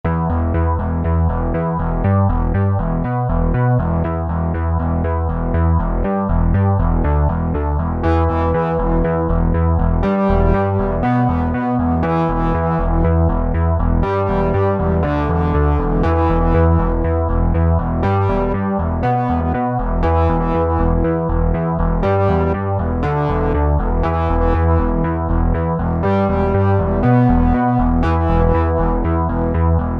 Music > Other
Background music menu screen
Background music I made for a smaller games menu screen. The song is made in Cubase with 2 helion vst synths at 120bpm. The track is meant to be simple with a feeling of 90s sci-fi movies.
digital, instrumental, Synth